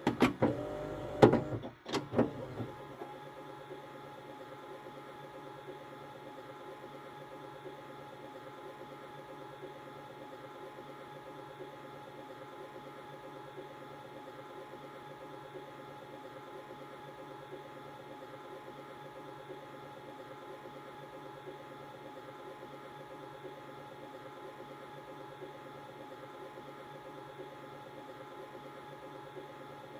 Sound effects > Objects / House appliances
COMAv-Samsung Galaxy Smartphone VCR, Rewinding VHS Tape Nicholas Judy TDC
A VCR rewinding a VHS tape.
vhs, rewind, vcr, tape, Phone-recording